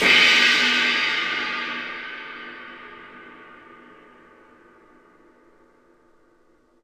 Instrument samples > Percussion
crash delayed attack - very long
• digitally low-pitched crash: 16" (inches) Sabian HHX Evolution Crash • microphone: Shure SM81